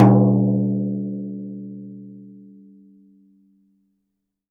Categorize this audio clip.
Music > Solo instrument